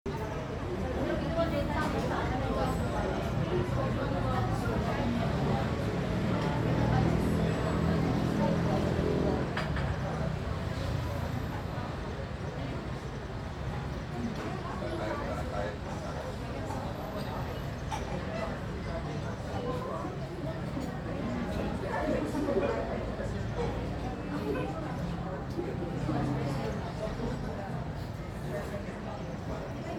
Soundscapes > Urban
Camp John Hay - General Ambience 3
This audio recording is included in the Camp John Hay Sounds Collection for the General Ambience Series pack. This recording, which immerses listeners in an authentic and richly textured soundscape, was done at Camp John Hay, a historic, pine-forested former U.S. Military Base in Baguio, Philippines, which has now been converted into a popular mixed-use tourist destination. The recording was made with a cellphone and it caught all the elements of nature and humans present at the site, such as the gentle rustling of pine needles swaying with the wind, birds calling from afar, visitors walking on gravel pathways, conversations being discreetly carried out, leaves flapping from time to time, and the quiet atmosphere of a high-up forested environment. These recordings create a very lifelike atmosphere that is very wide in usage from teaching to artistic work, and even in the background to just relaxing.